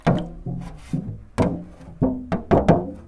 Sound effects > Other mechanisms, engines, machines
Woodshop Foley-093
oneshot, foley, rustle